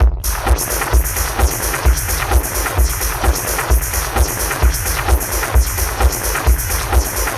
Music > Solo percussion
130 CR5000 Loop 03
130bpm, 80s, Analog, AnalogDrum, Beat, CompuRhythm, CR5000, Drum, DrumMachine, Drums, Electronic, Loop, music, Roland, Synth, Vintage